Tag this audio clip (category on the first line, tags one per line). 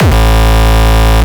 Instrument samples > Percussion
Frenchcore
Hardcore
Hardstyle